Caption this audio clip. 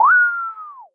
Sound effects > Electronic / Design
A sweet lil ringtone/chime, made in Ableton, processed in Pro Tools. I made this pack one afternoon, using a single sample of a Sine wave from Ableton's Operator, stretching out the waveform, and modulating the pitch transposition envelope.